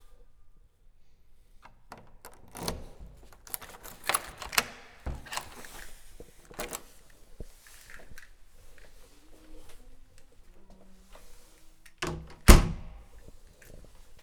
Sound effects > Objects / House appliances
Opening and closing door
The sound of me opening and closing the door to my house, including the lock mechanism. You can hear the reverb of the hall and how it fades as I walk inside. Recorded with the integrated microphones on a Zoom H5, stereo settings.
door, h5, lock